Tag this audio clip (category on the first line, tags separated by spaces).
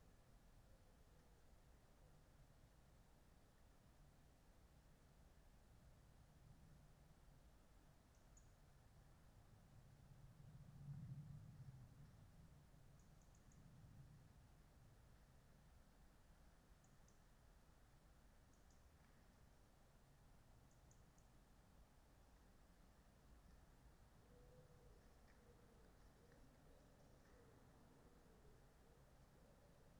Soundscapes > Nature
natural-soundscape Dendrophone artistic-intervention sound-installation weather-data soundscape data-to-sound field-recording modified-soundscape nature alice-holt-forest raspberry-pi phenological-recording